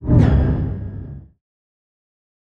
Sound effects > Other
Sound Design Elements Whoosh SFX 021
ambient, audio, cinematic, design, dynamic, effect, effects, element, elements, fast, film, fx, motion, movement, production, sound, sweeping, swoosh, trailer, transition, whoosh